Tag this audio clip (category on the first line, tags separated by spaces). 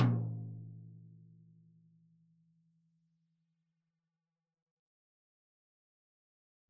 Music > Solo percussion
perc drum loop recording drums oneshot flam percussion roll beat kit Medium-Tom real drumkit wood maple med-tom acoustic toms Tom quality tomdrum realdrum